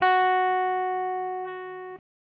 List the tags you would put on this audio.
Instrument samples > String
guitar
electric
stratocaster
electricguitar

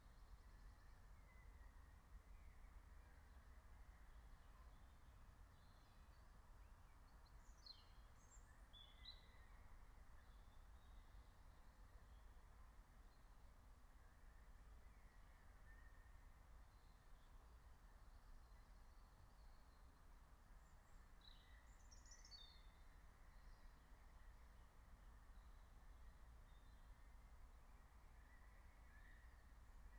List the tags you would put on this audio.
Soundscapes > Nature
field-recording
alice-holt-forest
phenological-recording
meadow
raspberry-pi
soundscape
nature
natural-soundscape

